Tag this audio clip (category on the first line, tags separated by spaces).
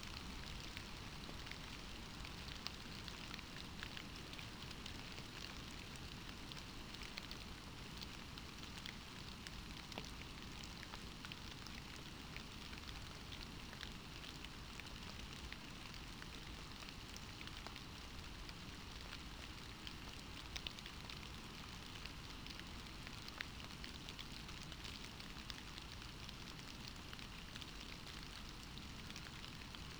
Soundscapes > Nature
alice-holt-forest Dendrophone modified-soundscape